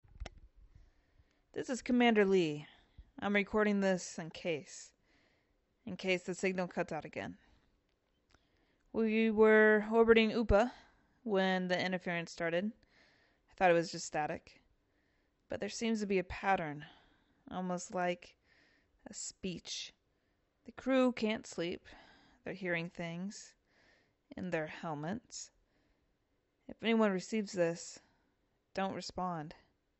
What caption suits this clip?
Speech > Solo speech
“Signal from Orbit” (sci-fi / eerie / transmission log)
A tense, cryptic message from a doomed crew—ideal for sci-fi thrillers, ARGs, or creepy audio logs.
scifi, Script, spacehorror, transmissionscript